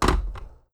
Sound effects > Objects / House appliances
COMTelph-Blue Snowball Microphone Nick Talk Blaster-Telephone, Receiver, Hang Up 04 Nicholas Judy TDC
A telephone receiver being hung up.
Blue-brand, hang-up